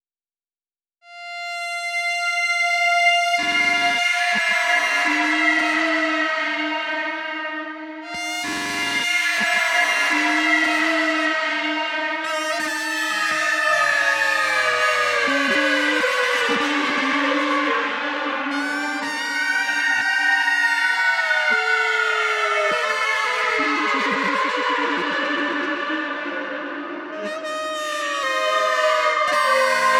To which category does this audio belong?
Music > Solo instrument